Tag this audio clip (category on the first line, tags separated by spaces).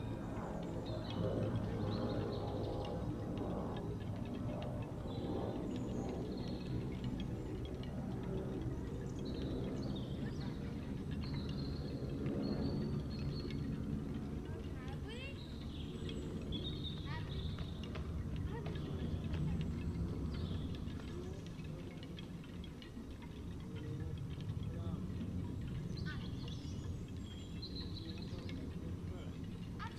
Soundscapes > Nature
alice-holt-forest artistic-intervention data-to-sound Dendrophone field-recording modified-soundscape phenological-recording raspberry-pi weather-data